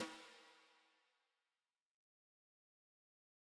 Music > Solo percussion
Snare Processed - Oneshot 61 - 14 by 6.5 inch Brass Ludwig
brass, fx, processed, kit, rimshots, perc, snares, beat, flam, snareroll, percussion, drumkit, acoustic, realdrums, rimshot, ludwig, drums, realdrum, rim, reverb, oneshot, crack, hit, sfx, hits, snaredrum, snare, drum, roll